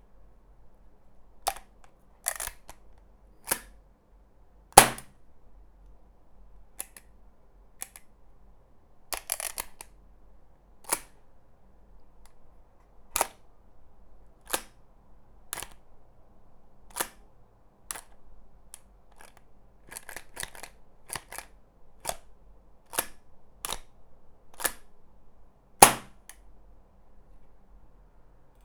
Sound effects > Objects / House appliances
dryfiring and fiddling with an airgun Recorded with a GHS-X10 headset